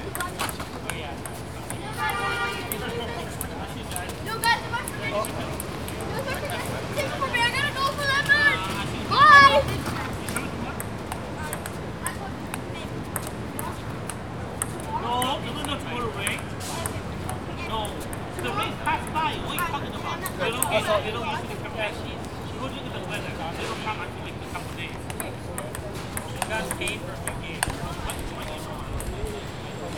Soundscapes > Urban
People playing ping pong at Seward Park
Some people playing ping pong at Seward Pard, Chinatown NYC
field, kids, people, pingpong, recording, walk